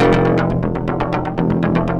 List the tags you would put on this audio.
Music > Solo percussion
120bpm Ableton chaos industrial loops soundtrack techno

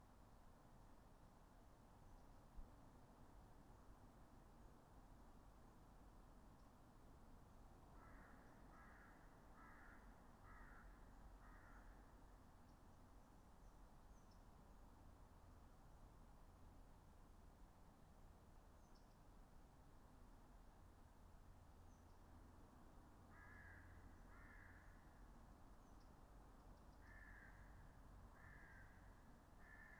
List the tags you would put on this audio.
Soundscapes > Nature
phenological-recording artistic-intervention weather-data soundscape natural-soundscape data-to-sound raspberry-pi field-recording Dendrophone modified-soundscape sound-installation nature alice-holt-forest

